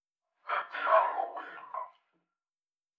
Speech > Processed / Synthetic
Recorded "Happy Halloween" and distorted with different effects.